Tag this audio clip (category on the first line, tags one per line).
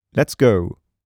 Speech > Solo speech
Calm
Generic-lines
Shotgun-mic
VA
MKE600
FR-AV2
Single-mic-mono
mid-20s
Voice-acting
Sennheiser
Male
movement
Shotgun-microphone
Tascam